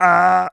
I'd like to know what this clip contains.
Speech > Solo speech
Frustrated Ahhh 2
Subject : Mid 20s male, when frustrated I let out a cheesy "AHHH" like a sigh. Date YMD : 2025 June 14 Location : Albi 81000 Tarn Occitanie France. Hardware : Tascam FR-AV2, Shure SM57 with A2WS windcover Weather : Processing : Trimmed in Audacity.